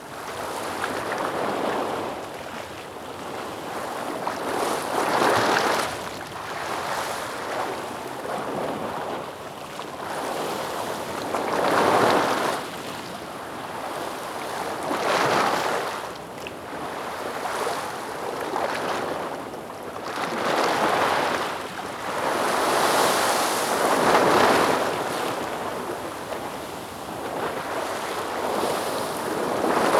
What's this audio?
Soundscapes > Nature
Ocean Pier - Sloshing Water, Salt Spray
Waves breaking against a concrete pier. Recorded with a Zoom H2n in 4 channel surround mode
beach, breaking, breaking-waves, coast, coastal, crashing, lapping, ocean, pier, relaxing, sea, sea-shore, seashore, seaside, shore, splash, splashing, spray, surf, tide, water, wave, waves